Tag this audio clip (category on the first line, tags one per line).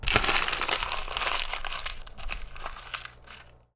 Sound effects > Objects / House appliances
Blue-brand
Blue-Snowball
crumple
foley
paper